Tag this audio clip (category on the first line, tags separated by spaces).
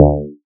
Instrument samples > Synths / Electronic
bass additive-synthesis